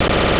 Sound effects > Electronic / Design
RGS-Glitch One Shot 2-Glitch Gun
All sample used from Bandlab: FO-REAL-BEATZ--TRENCH-BEATS Processed with ZL EQ, Waveshaper, Fracture, Vocodex.
Digital, FX, One-shot